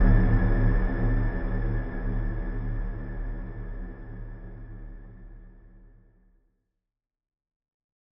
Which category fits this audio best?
Sound effects > Electronic / Design